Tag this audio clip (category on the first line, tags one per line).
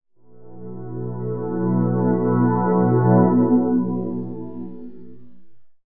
Instrument samples > Synths / Electronic
1lovewav
80s
analog
analogue
cinematic
electronic
sound-effect
synth